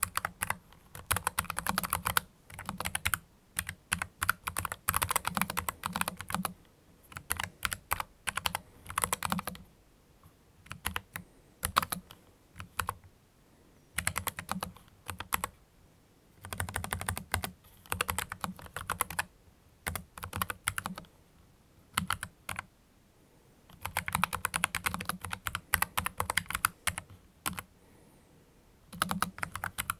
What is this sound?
Sound effects > Objects / House appliances
Typing this description on a Keychron K7 Pro mechanical keyboard, Gateron brown low-profile tactile switches. Recorded in a small room using Zoom H2n in mid/side mode. I make frequent errors and there is some breath noise as the mic is directly in front of my face.
brown, click, clicking, gateron, keyboard, keystroke, mechanical, mechanical-keyboard, switches, tactile, tap, tapping, type, typing